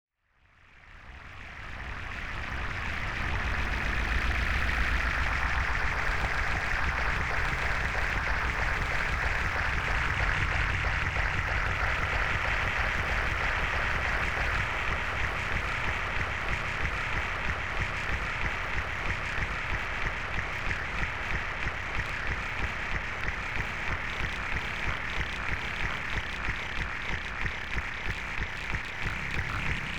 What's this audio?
Soundscapes > Synthetic / Artificial
RGS-Random Glitch Sound 7-Glitch Industry Ambient
Synthed with 3xOsc only. A beat loop from Bandlab as the carrier of the vocodex. Processed with OTT, ZL EQ, Fracture
Sound-design, Glitch, Ambient, Synthtic, Industry